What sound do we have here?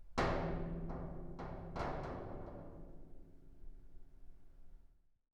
Sound effects > Objects / House appliances
Bomb shelter door bumping into wall 1
In the basement of our apartment building, there is a bomb shelter with heavy metal doors, kind of like submarine doors. This is the sound of it bumping into the wall.
closing, door, doors, heavy, metal, opening, shelter